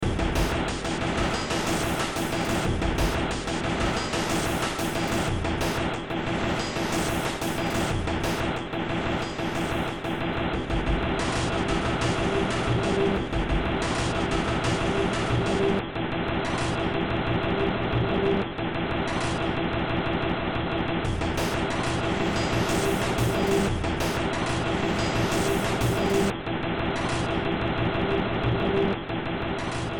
Music > Multiple instruments
Short Track #4021 (Industraumatic)
Games, Industrial, Sci-fi, Horror, Cyberpunk, Soundtrack, Ambient, Underground, Noise